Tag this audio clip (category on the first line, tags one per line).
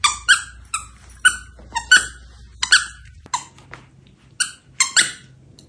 Sound effects > Objects / House appliances
meaning
squeaks
squeaky